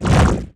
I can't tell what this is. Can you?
Sound effects > Electronic / Design
Hi ! If you used it in your games ,movies , Videos , you should tell me , because it's really cool!!! I just used a wavetable of Crystal and used ENV to give its WT Position some move. And Used a sine wave to modulate it Distortion is the final process Enjoy your sound designing day!